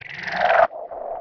Soundscapes > Synthetic / Artificial
LFO Birdsong 39
Birdsong, LFO, massive